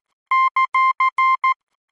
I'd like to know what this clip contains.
Sound effects > Electronic / Design
Morse Semicolon
A series of beeps that denote the semicolon in Morse code. Created using computerized beeps, a short and long one, in Adobe Audition for the purposes of free use.